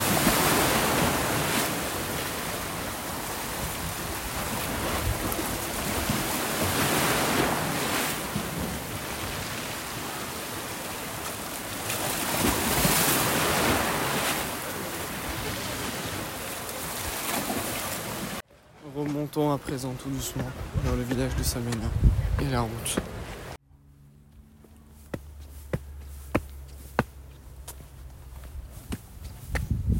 Sound effects > Human sounds and actions
Beach to road
Starting from the quiet, natural coastline of Saména, the soundscape is peaceful, with only waves and birds. As you walk inland through the village, the noise gradually increases. Near the main road, traffic sounds dominate, marking a clear shift from calm nature to urban activity.
Marseille, Sound-Urban-Nature, University